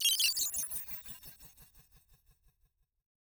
Sound effects > Electronic / Design
EXPERIMENTAL OBSCURE UNIQUE CLICK
BOOP, CHIPPY, EXPERIMENTAL, HARSH, HIT, SYNTHETIC